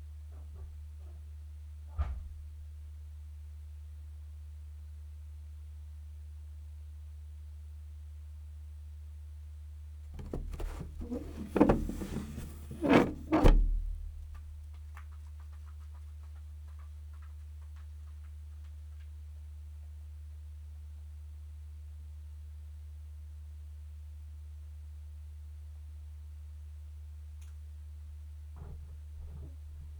Sound effects > Animals
Cat-flap 4
Subject : Date YMD : 2025 September 04 Location : Gergueil 21410 Bourgogne-Franche-Comté Côte-d'Or France. Hardware : DJI Mic 3 TX. Onboard recorder "Original" / raw mode. Weather : Processing : Trimmed and normalised in Audacity.
cat, cat-flap, 21410, door, France, pet-door, omni